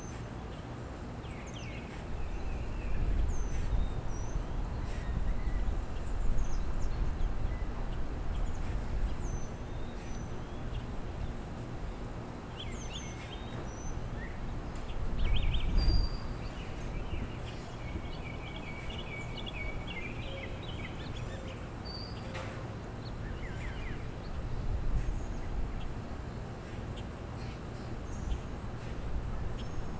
Soundscapes > Urban
Early morning on a coastal island residential street. Birdsong and insects, passing traffic, weed eater, yard work, 7:30AM.